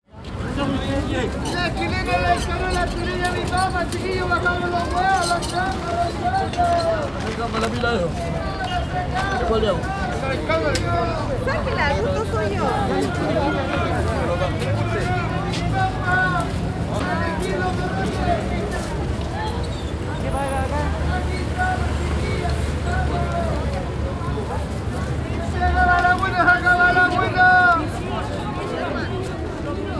Soundscapes > Urban
Feria Avenida Argentina Valparaiso
Soundscape. We can hear street vendors and people chatting in a market. Some truck engine sounds on the back. Recorden in Feria Avenida Argentina, Valparaiso, Chile.
field, south, market, Chile, Valparaiso, recording, america